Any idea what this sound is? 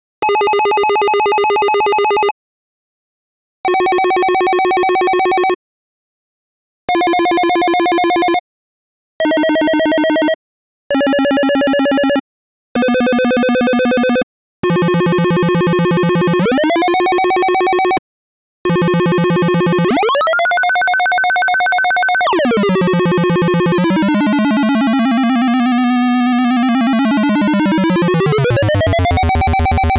Instrument samples > Synths / Electronic
30min of Dub siren (Square wave LFO)
Trying to make some dub siren blips. (Square wave LFO to pitch modulation) Adjusting filter and LFO rate etc.. I also have a significant amount of glide and moving up/down notes. Sometimes I moved more to some R2D2 like sounds or other more experimental, but still in the same idea of squarewave LFO pitch modulation. I left this dry so you can edit it. I'd suggest trimming it and adding a splash of reverb or delay of your own. I tried to do a few fixed pitches here and there. Nothing too methodical, I just hope you find something interesting in there. Made with a Roland S1 with audio over USB to Audacity. Trimmed and exported. I admit I got off-track at the end and used a random or "noise" LFO. Not 100% of it is squarewave.
synth, square-lfo, blip, Roland, Roland-S1, S1, dub, single-instrument, siren, LFO, dry, alarm